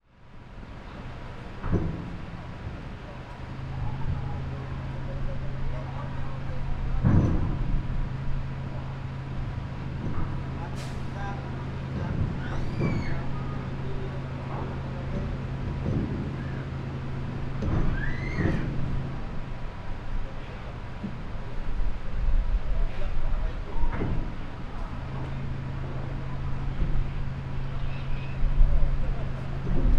Soundscapes > Other
250827 100222-2 PH Loading a ferryboat
Loading a ferryboat, then leaving. I made this recording in the harbour of Calapan city (Oriental Mindoro, Philippines), from the deck of a ferryboat. One can hear the vehicles (cars, motorcycles and trucks) loading the ship while people are talking and sometimes shouting on the pier. When finished, the gate of the ship are activated (at #15:18) and closed. Recorded in August 2025 with a Zoom H5studio (built-in XY microphones). Fade in/out applied in Audacity.
squeak, field-recording, bang, boom, port, squeaking, engine, ferry, trucks, ship, Philippines, harbour, Calapan-city, load, boat, noisy, voices, machine, pier, loading, ferryboat, motorcycles, heavy, vehicles, machinery, loud, atmosphere, noise, cars